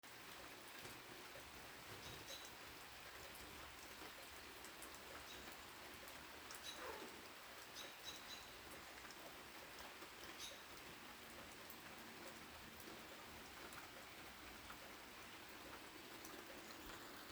Sound effects > Animals
Sound of bird chirping on a rainy day. Recorded while working.
Environment, Weather, Outdoors, Amb